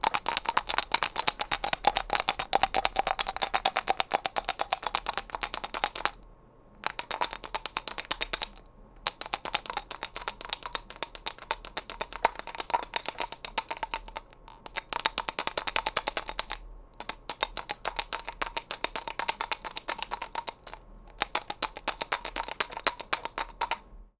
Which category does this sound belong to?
Sound effects > Objects / House appliances